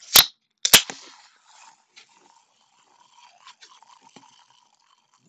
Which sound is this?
Sound effects > Objects / House appliances
Cracking can
Low quality lol
Lemonade, Fizz